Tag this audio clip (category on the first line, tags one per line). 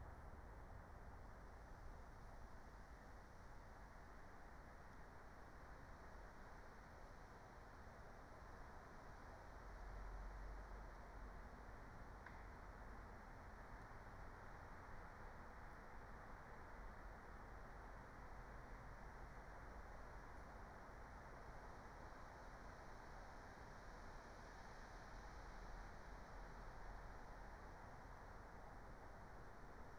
Soundscapes > Nature
alice-holt-forest
meadow
phenological-recording
natural-soundscape
nature
raspberry-pi
soundscape
field-recording